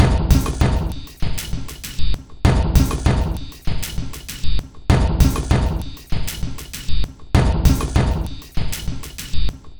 Instrument samples > Percussion
This 196bpm Drum Loop is good for composing Industrial/Electronic/Ambient songs or using as soundtrack to a sci-fi/suspense/horror indie game or short film.
Alien, Ambient, Dark, Drum, Industrial, Loop, Loopable, Packs, Samples, Soundtrack, Underground, Weird